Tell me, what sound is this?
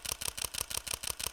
Sound effects > Objects / House appliances
Camera Shutter Burst
Recorded from a Canon EOS M200 Camera.